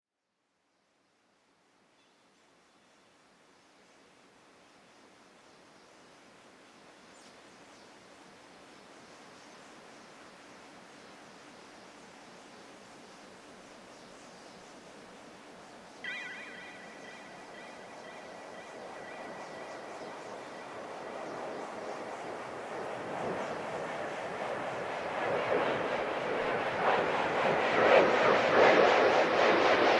Soundscapes > Other
Windchimes and a passing commercial jet recorded from my condo's deck. Audio was then "doctored" in AVS Audio editor with echo and flanging ("chaos setting") effects. The result has an "outer space" sci-fi sound. It also sounds like something early Pink Floyd would do.